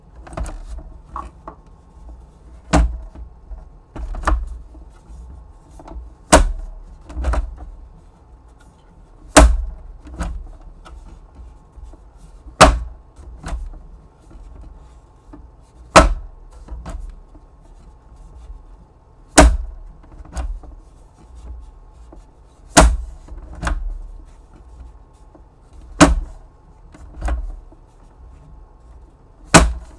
Sound effects > Objects / House appliances
COMPhono-Blue Snowball Microphone, CU Record Player, Crosley, Door, Open, Close Nicholas Judy TDC
A crosley record player door opening and closing.
Blue-brand, Blue-Snowball, close, door, foley, open, record-player